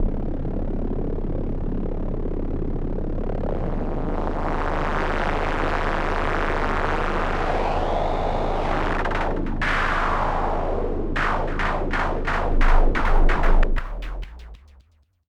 Sound effects > Experimental
Analog Bass, Sweeps, and FX-038
sfx, analog, weird, alien, electro, sample, fx, sweep, robotic, bass, robot, electronic, scifi, oneshot, effect, trippy, korg, vintage, retro, synth, complex, sci-fi, snythesizer, analogue, pad, machine, mechanical, dark, basses, bassy